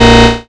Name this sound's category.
Instrument samples > Synths / Electronic